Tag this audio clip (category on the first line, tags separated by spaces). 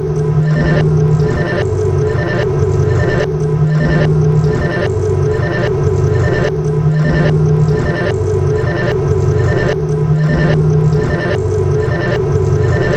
Instrument samples > Percussion
Weird Samples Loopable Dark Drum Soundtrack Alien Packs Ambient Industrial Loop Underground